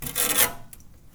Sound effects > Other mechanisms, engines, machines
Handsaw Oneshot Hit Stab Metal Foley 17
foley, fx, handsaw, hit, household, metal, metallic, perc, percussion, plank, saw, sfx, shop, smack, tool, twang, twangy, vibe, vibration